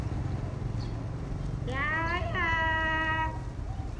Speech > Solo speech
Woman sell broom say 'Chổi không?' Record use iPhone 7 Plus smart phone 2025.11.10 07:19